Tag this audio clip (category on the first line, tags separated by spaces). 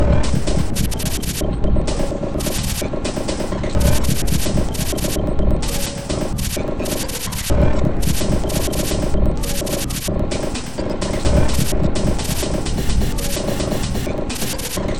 Instrument samples > Percussion
Loop Loopable Soundtrack Weird Alien Samples Ambient Underground Packs Dark Industrial Drum